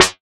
Instrument samples > Synths / Electronic
SLAPMETAL 4 Gb
additive-synthesis, fm-synthesis, bass